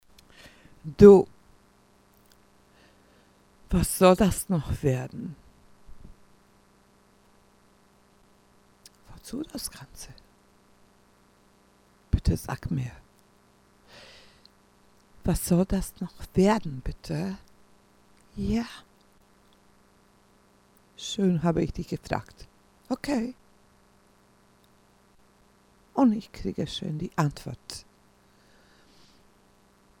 Speech > Other
Am besten wir reden schön mit einander
female, speak